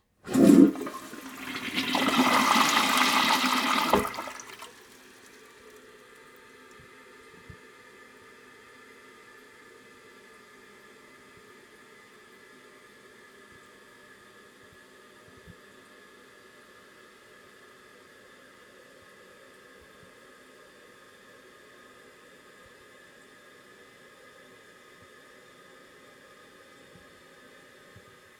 Sound effects > Objects / House appliances
Toilet at 21 Tagish Rd. - Main floor - Kohler
Flush of a Kohler toilet in the main floor bathroom of 21 Tagish Rd. in Whitehorse, Yukon. Recorded on a Zoom H2n using the standard setting for recording toilets north of the 60th parallel: 60-degree stereo.
toilet, toilet-flush, yukon, field-recording